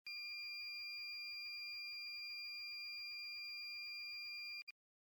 Sound effects > Objects / House appliances
Long high-pitched beep sound made with the buttons of an electric stove. Could be good for clinical death or some technology-related error.